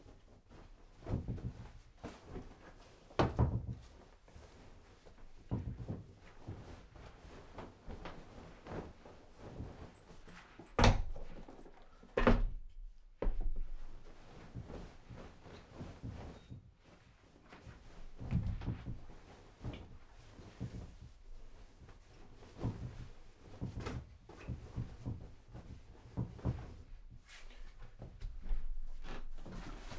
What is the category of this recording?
Sound effects > Objects / House appliances